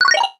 Sound effects > Electronic / Design
Synthy Chirps
A delightful lil chime/ringtone, made on a Korg Microkorg S, edited and processed in Pro Tools.
synth, microkorg, beep, computer, electronic, bleep, chirp, ringtone, sfx, ui, game, korg, gui, blip, click